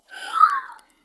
Sound effects > Objects / House appliances
weird blow and whistle mouth foley-009
foley, percussion, foundobject, fieldrecording, sfx, fx, perc, industrial, stab, bonk, object, natural, hit, drill, mechanical, oneshot, clunk, glass, metal